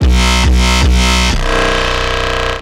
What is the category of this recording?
Sound effects > Electronic / Design